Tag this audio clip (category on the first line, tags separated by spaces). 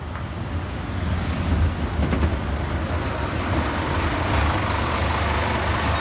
Sound effects > Vehicles
vehicle bus